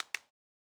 Sound effects > Natural elements and explosions
Stick Break
Snapping a stick in a forest. Recorded with a Rode NTG-3.
branch, break, breaking, crack, crunch, field-recording, forest, nature, snap, stick, stick-break, stickbreak, sticks, tree, wood